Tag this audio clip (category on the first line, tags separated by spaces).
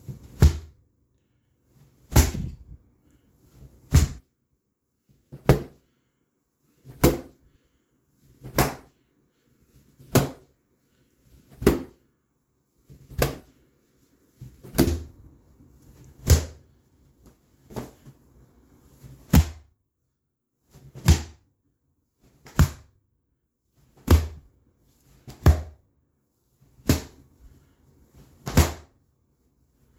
Sound effects > Objects / House appliances
cloth fight impact Phone-recording swish